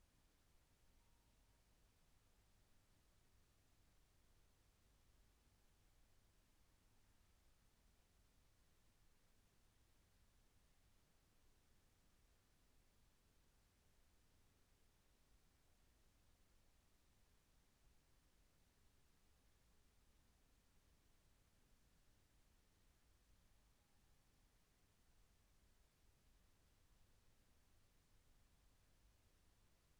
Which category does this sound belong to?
Soundscapes > Nature